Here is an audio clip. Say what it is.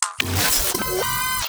Sound effects > Experimental
Gritch Glitch snippets FX PERKZ-011
abstract, alien, clap, crack, edm, experimental, fx, glitch, glitchy, hiphop, idm, impact, impacts, laser, lazer, otherworldy, perc, percussion, pop, sfx, snap, whizz, zap